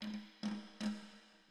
Music > Solo percussion
snare Processed - tap rolls - 14 by 6.5 inch Brass Ludwig
rimshots, brass, drums, drumkit, processed, hits, snare, perc, snaredrum, beat, hit, sfx, percussion, realdrum, acoustic, fx, rim, flam, crack, roll, ludwig, kit, snares, oneshot, snareroll, rimshot, realdrums, drum, reverb